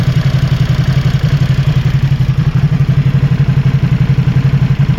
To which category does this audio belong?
Sound effects > Other mechanisms, engines, machines